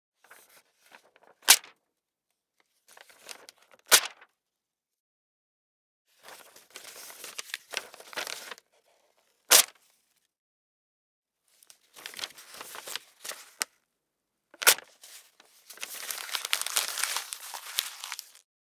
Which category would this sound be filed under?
Sound effects > Objects / House appliances